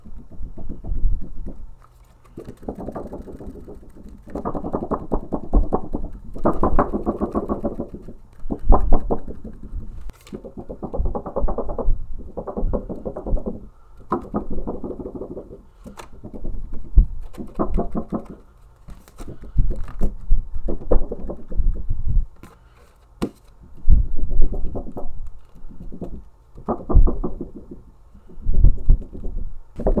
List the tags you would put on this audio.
Sound effects > Objects / House appliances
Blue-brand Blue-Snowball vinyl cartoon record wobble

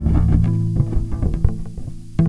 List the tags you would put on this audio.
Instrument samples > String
riffs funk loops loop bass rock slide oneshots pluck plucked

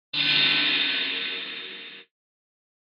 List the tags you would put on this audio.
Sound effects > Electronic / Design
electronic FX gate hat

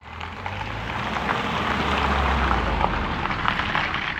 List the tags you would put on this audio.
Sound effects > Vehicles
car combustionengine driving

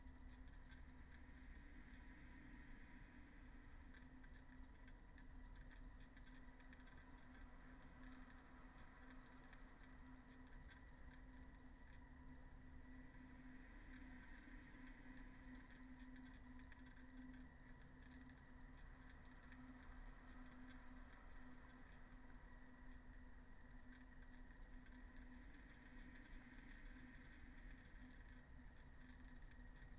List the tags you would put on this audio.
Soundscapes > Nature
alice-holt-forest sound-installation natural-soundscape modified-soundscape Dendrophone phenological-recording artistic-intervention

